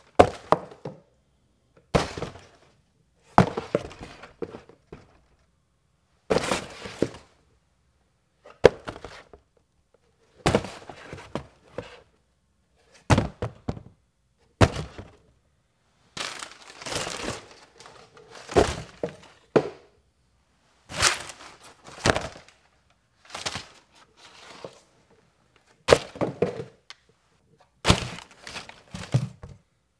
Sound effects > Objects / House appliances
Rustling Cardboard
Various sounds made from cardboard.
material, cardboard, paper, box, rustle, scrape